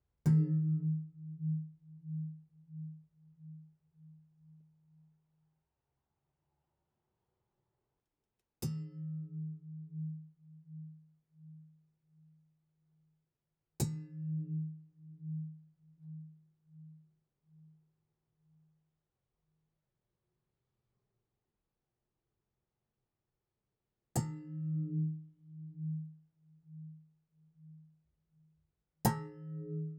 Sound effects > Objects / House appliances
Shovel gong 2
Nice sounding shovel Recorded with zoom H2n, edited with RX